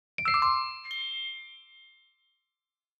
Music > Solo instrument
"Success" Sound effect
bells, uplifting, positive, success, happy, interface, achievement, ui, ux, sfx, glockenspiel, clean, sound